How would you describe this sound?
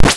Sound effects > Electronic / Design
LOUD noise sweep short
made in openmpt... again. mostly in the sample editor.
short, harsh, sound-design, digital, synth, noise, loud, sweep, electronic